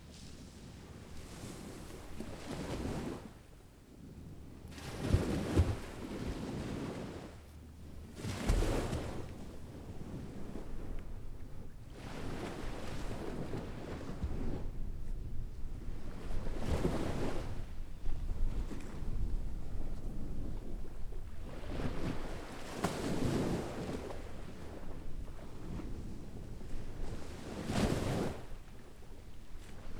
Soundscapes > Nature
WATRSurf-Gulf of Mexico Predawn Beach, calm surf 530AM QCF Gulf Shores Alabama Zoom H3VR
Gulf of Mexico Beach, Gulf Shores, Alabama, predawn. Waves, wind.
beach, field-recording, gulf, surf, waves, wind